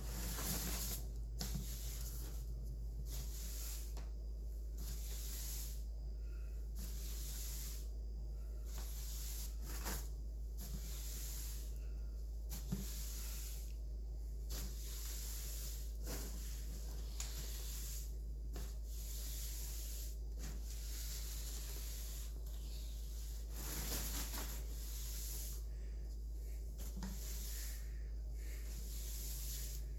Sound effects > Objects / House appliances
Sweeping with a broom.